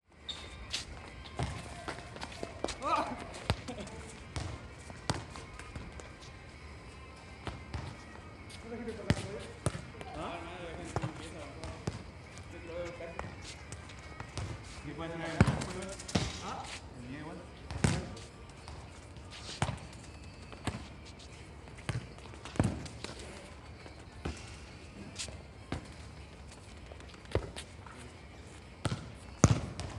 Soundscapes > Urban
Cancha baloncesto Valparaiso
Soundscape of people playing basketball in a street court.
Chile, field, game, Valparaiso